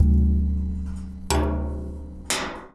Sound effects > Objects / House appliances
Junkyard Foley and FX Percs (Metal, Clanks, Scrapes, Bangs, Scrap, and Machines) 59
Recording from the local Junkyard in Arcata, CA. Metallic bangs and clanks with machines running and some employees yelling in the distance. Garbage, Trash, dumping, and purposefully using various bits of metal to bop and clang eachother. Tubes, grates, bins, tanks etc.Recorded with my Tascam DR-05 Field Recorder and processed lightly with Reaper
Percussion, Dump, Clank, Metallic, Foley, trash, scrape, Smash, Junk, Ambience, Machine, Robotic, Environment, SFX, Bang, dumpster, Metal, rattle, Robot, rubbish, Atmosphere, Bash, dumping, Perc, tube, waste, garbage, Clang, Junkyard, FX